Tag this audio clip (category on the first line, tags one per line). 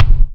Instrument samples > Percussion
pop
warmkick
metal
jazz
rock
mainkick
thrash-metal
death-metal
fatdrum
bassdrum
hit
fatkick
fat-drum
headsound
percussive
rhythm
fat-kick